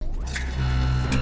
Sound effects > Electronic / Design
One-shot Glitch SFX with a mechanical Feel.
digital, electronic, mechanical, one-shot